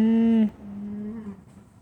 Sound effects > Animals
Miscellaneous Hoofstock - Alpaca; Two Moaning, Close Perspective
Two alpacas make a moaning sound, which was recorded with an LG Stylus 2022.
alpaca, livestock, farm, camel, moan